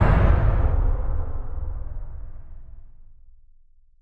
Sound effects > Electronic / Design
LONG OBSCURE RESONANT PUNCH
EXPERIMENTAL, TRAP, HIT, IMPACT, HIPHOP, LOW, RUMBLING, DEEP, BASSY, UNIQUE, BOOM, DIFFERENT, EXPLOSION, INNOVATIVE, RATTLING, RAP